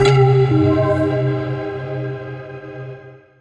Instrument samples > Synths / Electronic
CVLT BASS 44
subbass, wobble, subwoofer, synthbass, bassdrop, lfo, wavetable, low, bass, subs, drops, clear, synth, sub, lowend, stabs